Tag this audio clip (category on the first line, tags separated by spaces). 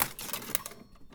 Sound effects > Other mechanisms, engines, machines
strike metal wood thud sfx little tink boom bop oneshot pop shop sound perc knock percussion crackle bang rustle fx bam tools foley